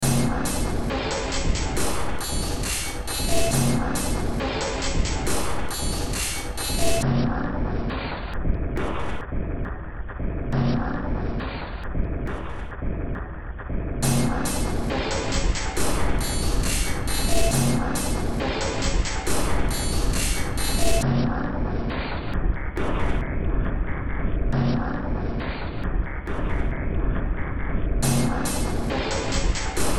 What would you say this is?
Music > Multiple instruments
Short Track #4056 (Industraumatic)
Ambient, Cyberpunk, Games, Industrial, Noise, Sci-fi, Underground